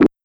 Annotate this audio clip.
Sound effects > Human sounds and actions
LoFiFootsteps Stone Walking-06
footstep, walk, jogging, steps, rocks, jog, walking, synth, stone, lofi